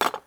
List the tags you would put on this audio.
Sound effects > Objects / House appliances
snip metal fx household cut slice foley perc scissor sfx tools scissors scrape

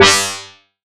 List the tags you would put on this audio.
Instrument samples > Synths / Electronic
additive-synthesis bass

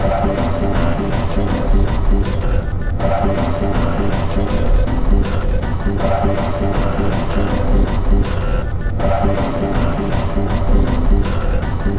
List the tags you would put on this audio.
Instrument samples > Percussion
Alien Ambient Dark Drum Industrial Loop Loopable Packs Samples Soundtrack Underground Weird

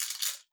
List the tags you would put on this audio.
Instrument samples > Percussion
adhesive ambient cellotape design drum experimental foley glitch layering one organic shot shots sound sounds tape unique

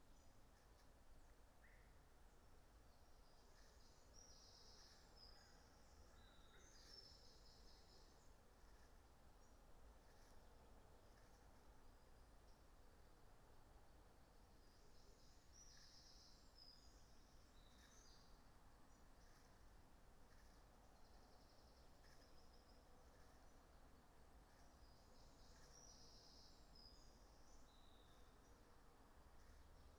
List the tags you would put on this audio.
Soundscapes > Nature
alice-holt-forest data-to-sound sound-installation soundscape weather-data

